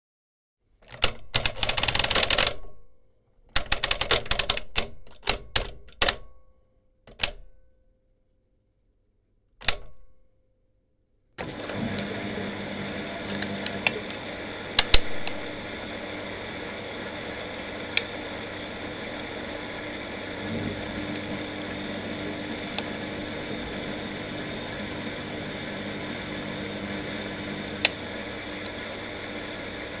Sound effects > Other mechanisms, engines, machines
Old washing machine from the 90s, Bosch WFA2070.
The entire washing process, from start to finish Tascam DR680 Mk2 and four microphones - Rode NT4, Placed close to the washing machine door - AKG C1000S, Placed at the top of the washing machine - First pickup microphone, Placed inside the detergent container - Second pickup microphone, Placed on the mechanical controller I removed the top cover of the washer for better sound By the way, This washing machine is 30 years old and has never broken down, it still works perfectly.
Machine, Home, Washer, Mechanism, Machinery